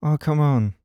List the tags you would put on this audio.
Speech > Solo speech
2025 Adult ahh Calm common exhausted FR-AV2 Generic-lines Hypercardioid july Male mid-20s MKE-600 MKE600 Sennheiser Shotgun-mic Shotgun-microphone Single-mic-mono Tascam VA Voice-acting

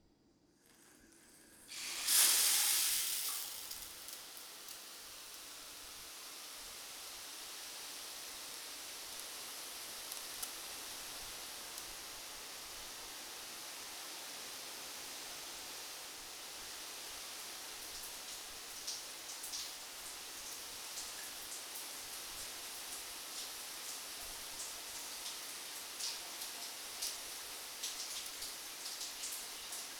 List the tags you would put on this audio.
Soundscapes > Indoors
Bathroom Shower stream water

